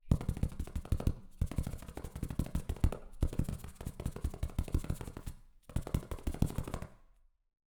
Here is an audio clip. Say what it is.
Sound effects > Human sounds and actions
Quick Steps SFX
A "quick steps" sound effect I made with my hands against a mat. Recorded with ZOOM H2N.
steps, run, shoes